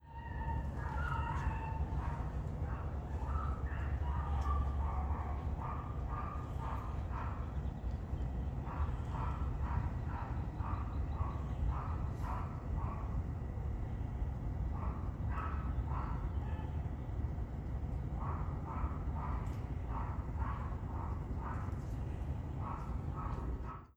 Sound effects > Animals
Rottweiler puppies howling and barking through a window.